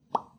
Sound effects > Human sounds and actions
Bubble Button
"Bubble" sound, recorded my own voice using a cellphone and edited with audacity to remove background noises.
bubbles, button, buttonsound, sfx, click